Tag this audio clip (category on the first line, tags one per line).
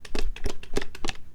Sound effects > Objects / House appliances
carton
clack
click
foley
industrial
plastic